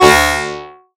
Instrument samples > Synths / Electronic
TAXXONLEAD 1 Gb
additive-synthesis, bass, fm-synthesis